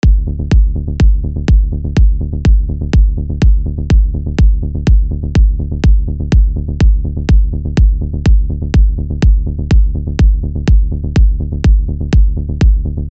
Instrument samples > Percussion
Ableton Live. VST.Serum......Drum and Bass Free Music Slap House Dance EDM Loop Electro Clap Drums Kick Drum Snare Bass Dance Club Psytrance Drumroll Trance Sample .
Bass, Clap, Dance, Drum, Drums, EDM, Electro, Free, House, Kick, Loop, Music, Slap, Snare